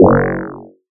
Instrument samples > Synths / Electronic
BWOW 1 Gb
fm-synthesis, bass, additive-synthesis